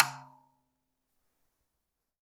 Music > Solo instrument
Rim Hit Perc Oneshot-003
Cymbal, Drum, GONG, Hat, Kit, Oneshot, Paiste, Percussion, Ride, Sabian